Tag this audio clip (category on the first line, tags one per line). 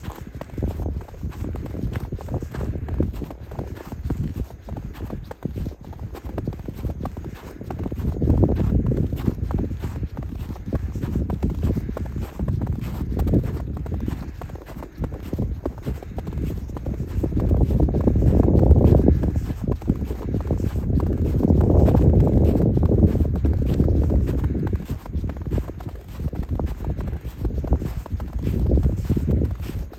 Soundscapes > Nature
footstep
breath
walking
snow
step
footsteps